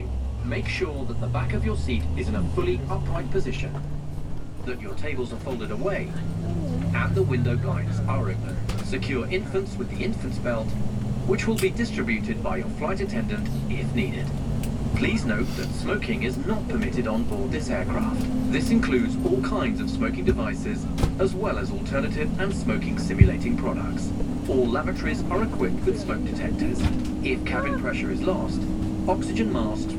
Soundscapes > Indoors
Airplane security announcement right before taking off Swiss airline Recorded with Tascam Portacapture X6
plane, aircraft, runway, field-recording, Announcement, takeoff, airplane, take-off